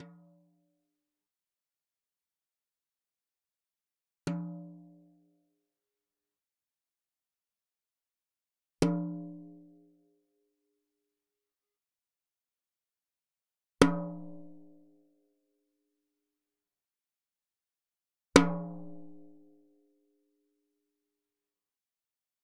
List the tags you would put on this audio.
Music > Solo percussion
hi-tom
percs
beatloop
flam
oneshot
percussion
toms

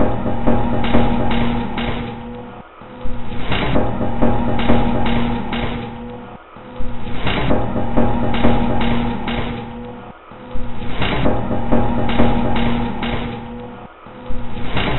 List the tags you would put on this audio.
Instrument samples > Percussion
Drum
Ambient
Loopable
Packs
Industrial
Dark
Weird
Underground
Soundtrack
Loop
Alien
Samples

